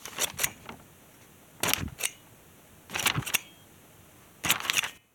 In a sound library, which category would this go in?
Sound effects > Objects / House appliances